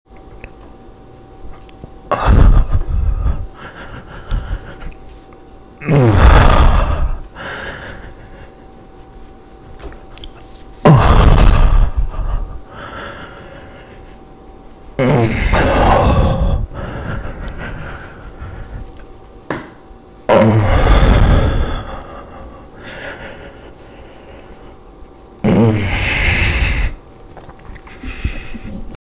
Sound effects > Human sounds and actions
Some upclose sounds of me stroking and moaning into my blue yeti mic.